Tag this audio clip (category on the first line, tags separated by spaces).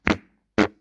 Sound effects > Other
fart flatulence gas